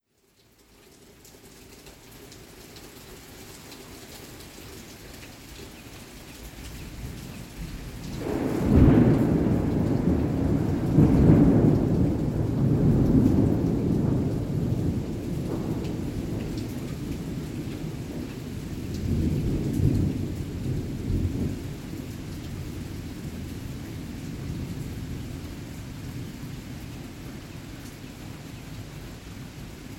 Soundscapes > Nature
STORM-Samsung Galaxy Smartphone, CU Thunder Rumbles, Crashes, Rain Nicholas Judy TDC
Thunder rumbles and crashes with rain.